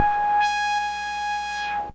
Sound effects > Experimental
Analog Bass, Sweeps, and FX-169
trippy, synth, sfx, robot, bass